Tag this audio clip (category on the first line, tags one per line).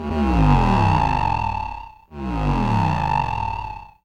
Sound effects > Experimental
mechanical
basses
machine
sci-fi
analogue
vintage
oneshot
bass
scifi
robot
sweep
complex
alien
trippy
retro
dark
sfx
snythesizer
electro
korg
robotic
sample
synth
pad
fx
effect
weird
analog
bassy
electronic